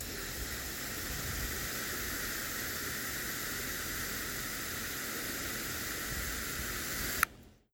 Sound effects > Objects / House appliances
WATRSpray-Samsung Galaxy Smartphone, MCU Water Hose, Jet Mode Nicholas Judy TDC
A water hose spraying in jet mode.
jet, Phone-recording, spray